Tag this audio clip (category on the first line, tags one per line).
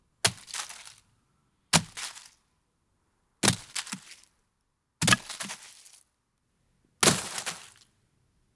Sound effects > Natural elements and explosions
autumn
cone
field-recording
hit
impact
leaves
pine-cone
rustle
stereo
throw